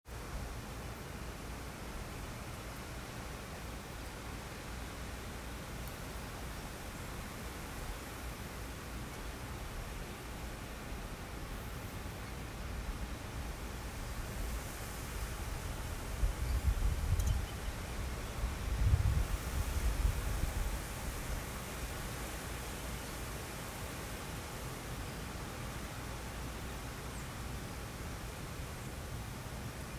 Soundscapes > Nature
Recorded with an iPhone XR.